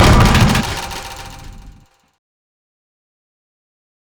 Sound effects > Other
Sound Design Elements Impact SFX PS 105
All samples used in the production of this sound effect are field recordings recorded by me. Recording gear-Tascam Portacapture x8 and Microphone - RØDE NTG5.The samples of various types of impacts recorded by me were layered in Native Instruments Kontakt 8, then the final audio processing was done in REAPER DAW.
audio, blunt, cinematic, collision, crash, design, effects, explosion, force, game, hard, heavy, hit, impact, percussive, power, rumble, sfx, sharp, shockwave, smash, sound, strike, thudbang, transient